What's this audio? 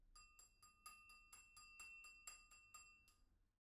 Sound effects > Other
Glass applause 12 (microtake)
applause, NT5, single, glass, clinging, stemware, cling, FR-AV2, individual, wine-glass, Tascam, solo-crowd, XY, person, indoor, Rode